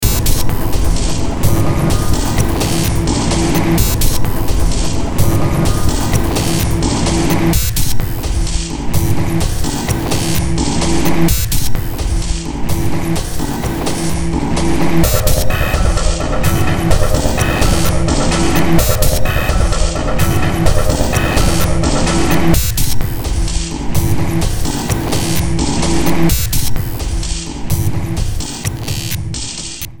Music > Multiple instruments
Demo Track #3626 (Industraumatic)
Ambient, Cyberpunk, Games, Horror, Industrial, Noise, Sci-fi, Soundtrack, Underground